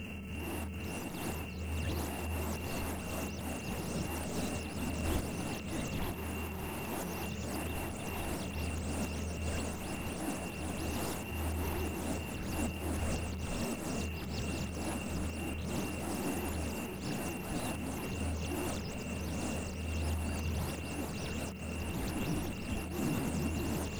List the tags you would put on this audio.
Soundscapes > Synthetic / Artificial
electronic
experimental
free
glitch
granulator
noise
packs
sample
samples
sfx
sound-effects
soundscapes

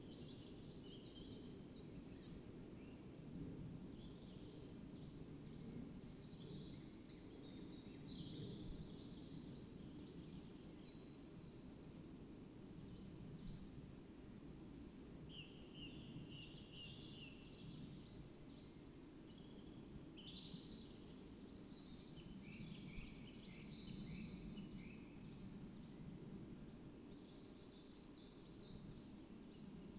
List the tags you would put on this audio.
Soundscapes > Nature
alice-holt-forest
artistic-intervention
data-to-sound
Dendrophone
field-recording
modified-soundscape
natural-soundscape
nature
phenological-recording
raspberry-pi
sound-installation
soundscape
weather-data

